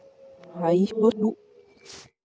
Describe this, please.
Speech > Solo speech
Walk Backwards to Go Forward